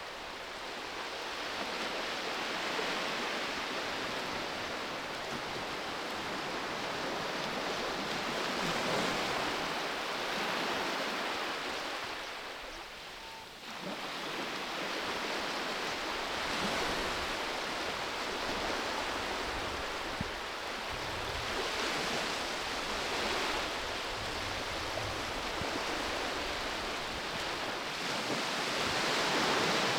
Soundscapes > Nature
SeaShore Wave 3
wave, nature, beach, seashore, sea